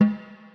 Music > Solo percussion
Snare Processed - Oneshot 83 - 14 by 6.5 inch Brass Ludwig
beat, reverb, sfx, rimshot, ludwig, roll, realdrum, drumkit, processed, crack, perc, brass, snares, percussion, rim, snareroll, hits, snare, acoustic, flam, hit, rimshots, snaredrum, oneshot, drums, drum, kit, fx, realdrums